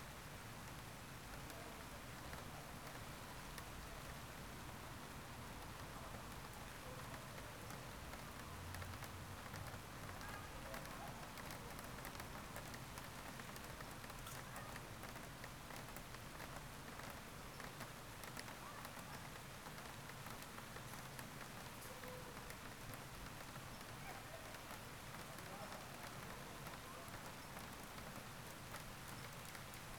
Soundscapes > Nature
Light Rain with Voices
Gentle rainfall with clearly defined raindrops, distant human voices, and soft courtyard ambience. Subtle urban presence and natural textures from a Georgian residential area. If you’d like to support my work, you can get all my ambience recordings in one pack on a pay-what-you-want basis (starting from just $1). Your support helps me continue creating both free and commercial sound libraries! 🔹 What’s included?